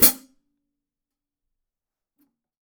Music > Solo instrument
Vintage Custom 14 inch Hi Hat-026
Drum, Hats, Hat, Drums, Vintage, Perc, Custom, Cymbals, HiHat, Oneshot, Kit, Metal, Percussion, Cymbal